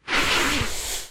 Sound effects > Other
combo wind fire
26 - Combined Wind and Fire Spells Sounds foleyed with a H6 Zoom Recorder, edited in ProTools together